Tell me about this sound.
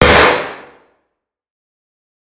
Sound effects > Natural elements and explosions
Kalashnikov 1 (indoor)
(1/2) Gunshot of an AK47 fired at the top of a staircase, cleaned up and detailed in post. Recorded using phone microphone.
gunshot, bang, shot, gun